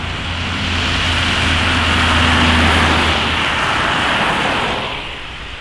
Sound effects > Vehicles
Car00060103CarMultiplePassing
rainy, field-recording, car, vehicle, automobile, drive